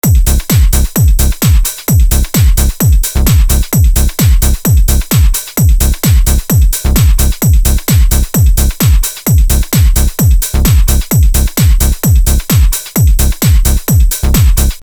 Music > Multiple instruments
Ableton Live. VST.Serum......Drums and Bass Free Music Slap House Dance EDM Loop Electro Clap Drums Kick Drum Snare Bass Dance Club Psytrance Drumroll Trance Sample .
Bass, Clap, Dance, Drum, Drums, EDM, Electro, Free, House, Kick, Loop, Music, Slap, Snare